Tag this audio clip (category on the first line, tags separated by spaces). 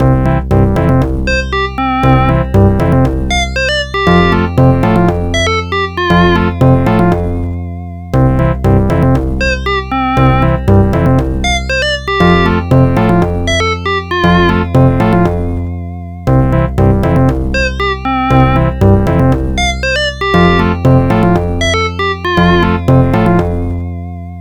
Music > Multiple instruments
Brass FM Loop Silly